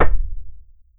Sound effects > Human sounds and actions
LoFiFootstep Carpet 06
Shoes on carpet. Lo-fi. Foley emulation using wavetable synthesis.
footsteps, synth, footstep